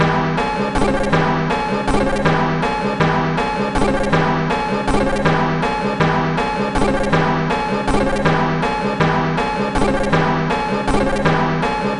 Instrument samples > Percussion
This 160bpm Drum Loop is good for composing Industrial/Electronic/Ambient songs or using as soundtrack to a sci-fi/suspense/horror indie game or short film.
Underground, Soundtrack, Loopable, Loop, Weird, Dark, Drum, Industrial, Alien, Ambient, Packs, Samples